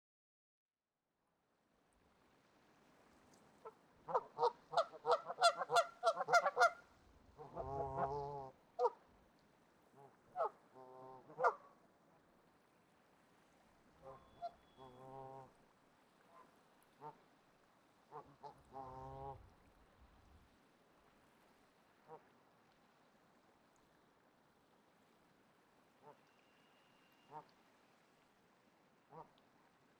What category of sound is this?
Soundscapes > Nature